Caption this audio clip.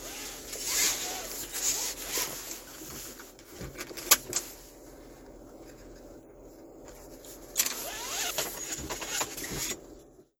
Sound effects > Vehicles
VEHMech-Samsung Galaxy Smartphone, CU Seatbelt, Click, Release, Zuzzes Nicholas Judy TDC
A seatbelt clicking and releasing with nylon zuzzes.
foley, Phone-recording, nylon, release, zuzz, click, seatbelt